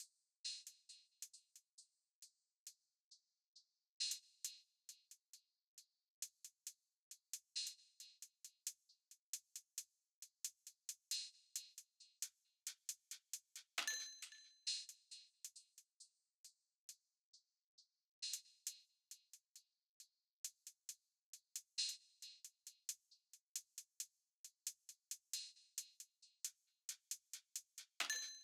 Instrument samples > Percussion
fun hi-hat loop with percs (135bpm)
All the free wavs in this pack are Ableton drum racks that I've cut into loops and exported from old beats that never left my computer. They were arranged years ago in my late teens, when I first switched to Ableton to make hip-hop/trap and didn't know what I was doing. They are either unmixed or too mixed with reverb built in. Maybe I'm being too harsh on them. I recommend 'amen breaking' them and turning them into something else, tearing them apart for a grungy mix or layering to inspire pattern ideas. That's what I love doing with them myself.
135bpm, drum, hihats, symbols